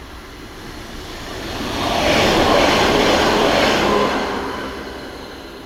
Sound effects > Vehicles
Sound recording of a tram passing by. Recording done in Hallilan-raitti, Hervanta, Finland near the tram line. Sound recorded with OnePlus 13 phone. Sound was recorded to be used as data for a binary sound classifier (classifying between a tram and a car).